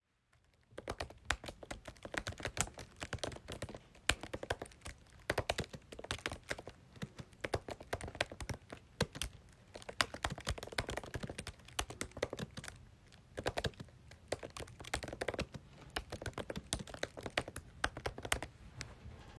Sound effects > Objects / House appliances
Typing on a keyboard at a fast pace.
business, laptop, typing